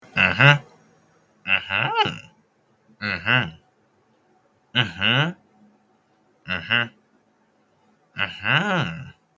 Speech > Solo speech
Me saying Mm-hmm in 5 takes. Recorded with webcam microphone.